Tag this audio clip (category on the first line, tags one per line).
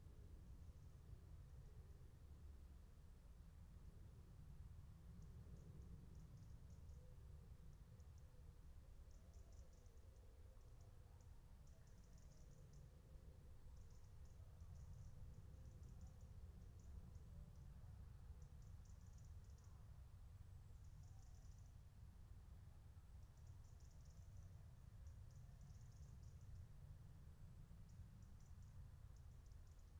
Soundscapes > Nature
field-recording
natural-soundscape
raspberry-pi
phenological-recording
meadow
soundscape
nature
alice-holt-forest